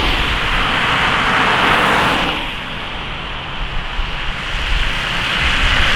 Sound effects > Vehicles
Car00057738CarMultiplePassing
automobile, car, drive, field-recording, rainy, vehicle